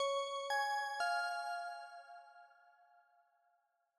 Sound effects > Other mechanisms, engines, machines
Sounds like when you walk into a store and the electronic sound is a major chord, bing, bing, bing.